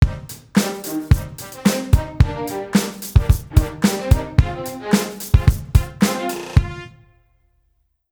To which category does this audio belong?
Music > Multiple instruments